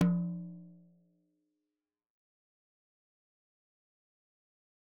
Music > Solo percussion
Hi Tom- Oneshots - 38- 10 inch by 8 inch Sonor Force 3007 Maple Rack
acoustic, beat, beatloop, beats, drum, drumkit, drums, fill, flam, hi-tom, hitom, instrument, kit, oneshot, perc, percs, percussion, rim, rimshot, roll, studio, tom, tomdrum, toms, velocity